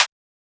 Instrument samples > Percussion
8 bit-Noise Shaker2
8-bit, FX, game, percussion